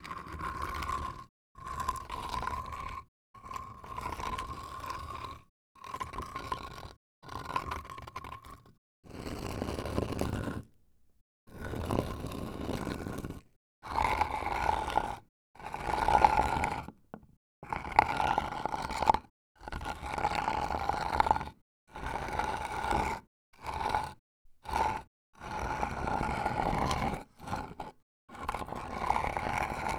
Sound effects > Natural elements and explosions
Rock sliding
A medium sized rock slinding on concrete